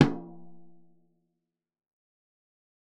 Music > Solo percussion
Med-low Tom - Oneshot 50 12 inch Sonor Force 3007 Maple Rack
real, acoustic, drums, toms, kit, med-tom, tomdrum, loop, recording, drumkit, maple, percussion, flam, beat, Tom, roll, drum, quality, realdrum, Medium-Tom, perc, wood, oneshot